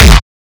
Instrument samples > Percussion
BrazilFunk Kick 14-Processed
Kick, Brazilian, BrazilFunk, BrazilianFunk, Distorted